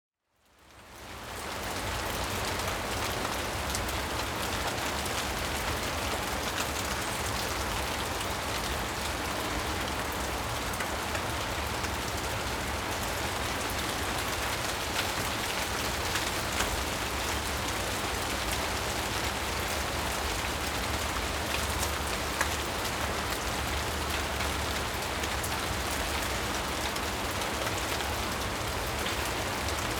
Soundscapes > Urban
You can hear light to moderate rain. Recorded in the city of Munich, in a garden. The microphone was placed in a wooden garden house, with the door open for the recording. Near the garden house, there was a tree with many leaves on which the rain was falling. Occasionally, you can hear birds chirping and public transportation, but very subtly. The main sound is the rain.